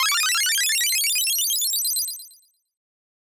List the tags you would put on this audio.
Sound effects > Electronic / Design
8-bit upgrade retro coin arcade chippy videogame

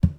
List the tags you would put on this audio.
Sound effects > Objects / House appliances
clatter slam cleaning object lid tool metal garden hollow household spill bucket water shake container kitchen drop knock pour carry fill scoop handle debris tip pail plastic clang foley liquid

